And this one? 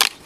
Sound effects > Electronic / Design
User Interface Elegant Wooden Confirmation Sound
Wooden, fine, tactile UI sound, something positive, clear and brief. This is actually a piece of handling sound of a Canon EOS M50, normalized and extracted using Audacity.
picking-up, touch, clicking, touching, ui, pickup, click, confirm, wooden, button, user-interface, confirmation